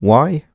Speech > Solo speech
Displeasure - Why
Man, Vocal, dissapointed, Tascam, Mid-20s, Video-game, FR-AV2, why, disappointed, talk, oneshot, displeasure, U67, Voice-acting, Single-take, Human, singletake, Neumann, dialogue, voice, Male, NPC